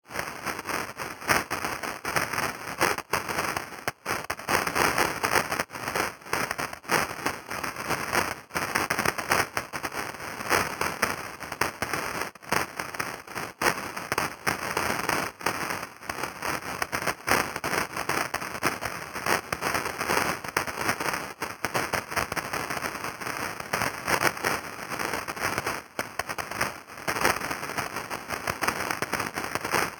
Sound effects > Objects / House appliances
I synth it with phasephant! Used the Footsteep sound from bandLab PROTOVOLT--FOLEY PACK. And I put it in to Granular. I used Phase Distortion to make it Crunchy. Then I give ZL Equalizer to make it sounds better.